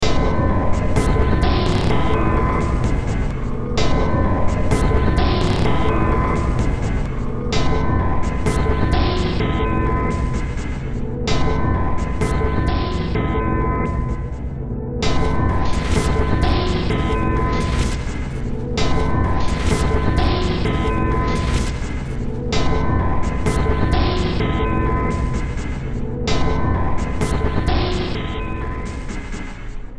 Music > Multiple instruments
Demo Track #3363 (Industraumatic)
Ambient, Cyberpunk, Games, Horror, Industrial, Noise, Sci-fi, Soundtrack, Underground